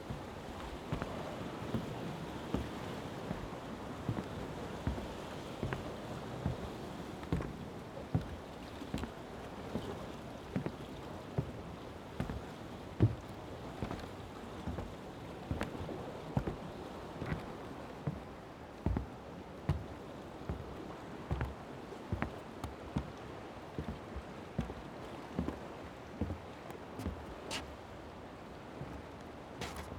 Sound effects > Human sounds and actions
footsteps-wood-docker-sea
recorded with zoom h6
seaside, dock, wood, footsteps